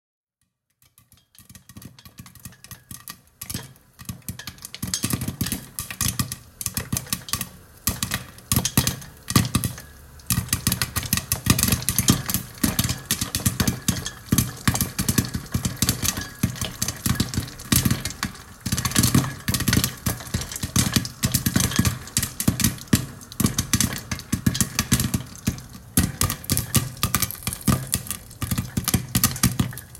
Sound effects > Natural elements and explosions
Percusion maiz cancha en coccion Lima Peru
Cooking corn. Made from large, dried corn kernels that pop slightly when toasted in a pan without losing their shape. It's the essential sidekick for classic ceviches, chicha drinks, and even grandma's spicy stews. Peruvian cancha corn is the iconic toasted and crunchy corn, a legacy from pre-Hispanic times.
FOOD, recording, PERCUSIVE, field, peru, CORN, lima